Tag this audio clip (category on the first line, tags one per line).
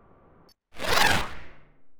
Sound effects > Electronic / Design
rhythm
scifi
noise
royalty
free
industrial-noise
sci-fi
sound-design
creative
commons